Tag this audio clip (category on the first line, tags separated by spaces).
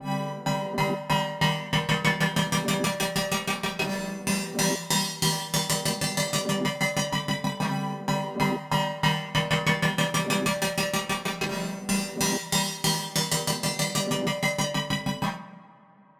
Music > Other
bigroom festival rave techno trance